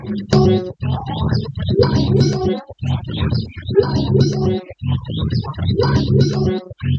Sound effects > Electronic / Design
Stirring The Rhythms 6
This is a dark pad and ambient pack suitable for sci-fi, horror, mystery and dark techno content. The original 20 samples were made with Waldorf PPG Wave 2.2 vst, modeled after the hardware synth. They include both very high and very low pitches so it is recommended to sculpt out their EQ to your liking. The 'Murky Drowning' samples are versions of the original samples slowed down to 50 BPM and treated with extra reverb, glitch and lower pitch shifting. The, 'Roil Down The Drain' samples are barely recognizable distorted versions of the original samples treated with a valve filter and Devious Machines Infiltrator effects processor. The, 'Stirring The Rhythms' samples were made by loading up all the previous samples into Glitchmachines Cataract sampler.
dark-soundscapes, dark-design, noise, vst, industrial-rhythm, noise-ambient